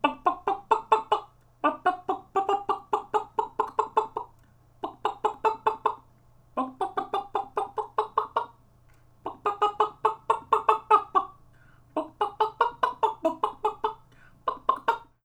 Sound effects > Animals
TOONAnml-Blue Snowball Microphone, CU Chicken, Comedic, Cluck, Human Imitation Nicholas Judy TDC
A comedic chicken cluck. Human imitation.
Blue-brand, Blue-Snowball, chicken, cluck, comedic, human, imitation